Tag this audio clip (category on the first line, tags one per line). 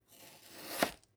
Sound effects > Other
Chopping
Cook
Cooking